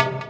Instrument samples > Synths / Electronic
CVLT BASS 137
bass, clear, bassdrop, synth, subwoofer, stabs, synthbass, low, wobble, lfo, drops, sub, wavetable, subs, subbass, lowend